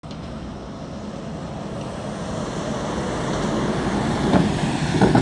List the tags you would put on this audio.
Soundscapes > Urban
city driving tyres

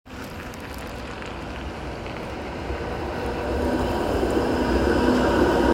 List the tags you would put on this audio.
Sound effects > Vehicles
city tram public-transport

